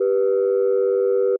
Instrument samples > Synths / Electronic
Landline Phonelike Synth G5
I was messing around in FL Studio using a tool/synth called Fluctus. It's basically a synth which can produce up to 3 concurrent tones. With two sine waves, the second of which tuned to a just-intuned minor 3rd (386 cents) above the first tone, each tone makes a sort of "holding tone" that is reminiscent of land-line phones.